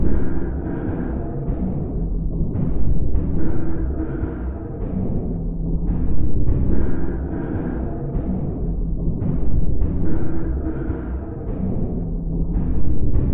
Soundscapes > Synthetic / Artificial
This 144bpm Ambient Loop is good for composing Industrial/Electronic/Ambient songs or using as soundtrack to a sci-fi/suspense/horror indie game or short film.
Ambient, Industrial, Loopable